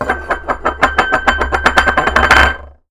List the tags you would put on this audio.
Sound effects > Objects / House appliances
plate
short
Blue-Snowball
foley
ceramic
cartoon
Blue-brand
spin

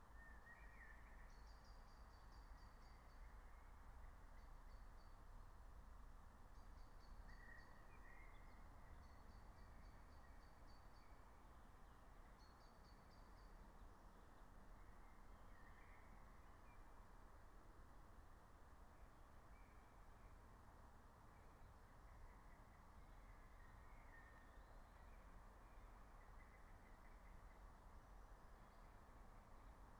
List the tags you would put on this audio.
Soundscapes > Nature
alice-holt-forest
natural-soundscape
raspberry-pi
soundscape
meadow
nature
field-recording
phenological-recording

